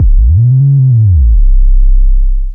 Instrument samples > Synths / Electronic
CVLT BASS 175
lfo, wavetable, subbass, synthbass, clear, stabs, low, subwoofer, bass, lowend, sub, drops, bassdrop, subs, wobble, synth